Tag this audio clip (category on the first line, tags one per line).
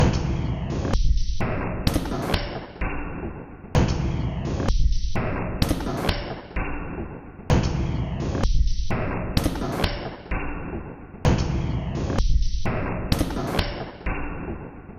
Instrument samples > Percussion
Loop
Soundtrack
Dark
Weird
Drum
Loopable
Ambient
Alien
Packs
Samples
Industrial
Underground